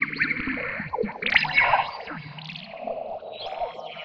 Soundscapes > Synthetic / Artificial
LFO Birdsong 48
LFO, Birdsong, massive